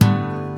Music > Solo instrument
Acoustic Guitar Oneshot Slice 74
acoustic, chord, foley, fx, knock, note, notes, oneshot, pluck, sfx, string, strings, twang